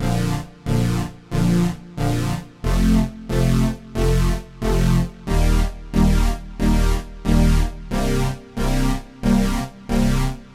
Instrument samples > Synths / Electronic
91 BPM synth loop Korg
Made in FL Studio Instrument: Korg Wavestation 91 BPM
synth, loop, melody